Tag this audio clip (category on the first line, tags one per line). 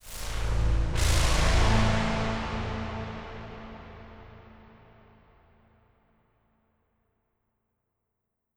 Instrument samples > Synths / Electronic
flstudio24
audacity